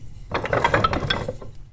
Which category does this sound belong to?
Music > Solo instrument